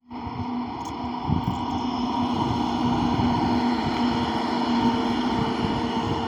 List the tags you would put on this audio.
Sound effects > Vehicles
drive tram vehicle

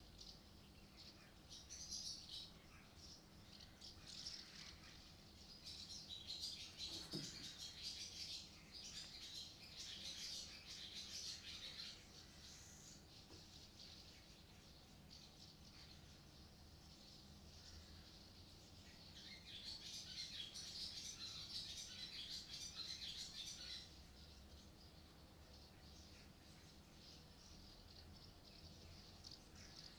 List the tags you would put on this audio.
Soundscapes > Nature
field-recording dawn birds spring doves

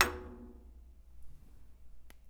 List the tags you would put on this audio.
Sound effects > Objects / House appliances
bonk clunk drill fieldrecording foley foundobject fx glass hit industrial mechanical metal natural object oneshot perc percussion sfx stab

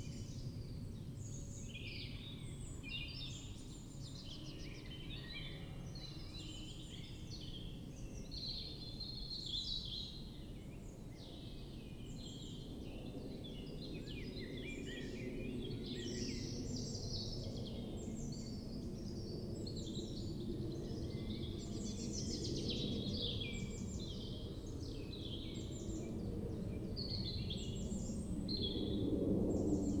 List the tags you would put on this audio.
Soundscapes > Nature
natural-soundscape data-to-sound artistic-intervention field-recording sound-installation nature weather-data soundscape alice-holt-forest phenological-recording Dendrophone raspberry-pi modified-soundscape

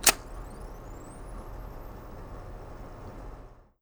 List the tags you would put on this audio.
Sound effects > Objects / House appliances
whine fuji-instax-mini-9 electronic camera lens Blue-Snowball Blue-brand

